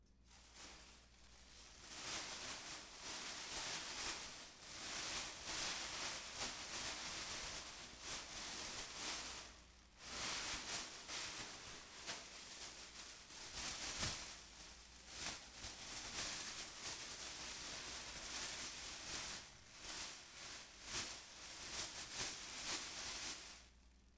Sound effects > Objects / House appliances
Tweaking many plastic bags. Recorded with Zoom H2.